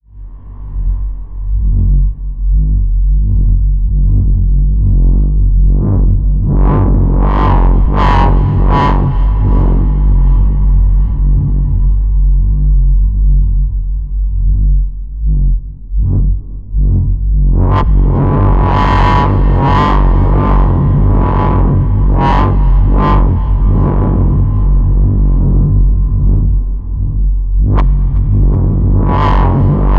Music > Solo instrument
Distorted bass drone
A deep and dark bass drone with a random LFO on filter cutoff, created on VCV Rack 2.
bass, dark, distortion, drone, sfx, synth